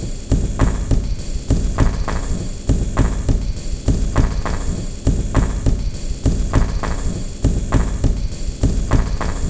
Instrument samples > Percussion
This 202bpm Drum Loop is good for composing Industrial/Electronic/Ambient songs or using as soundtrack to a sci-fi/suspense/horror indie game or short film.
Ambient, Dark, Loop, Loopable, Samples, Underground